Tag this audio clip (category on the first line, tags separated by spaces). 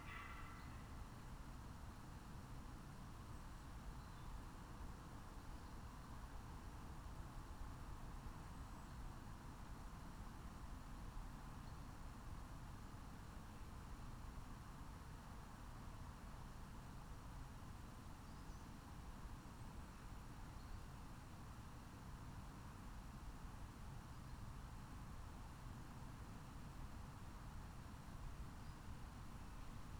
Soundscapes > Nature
phenological-recording nature raspberry-pi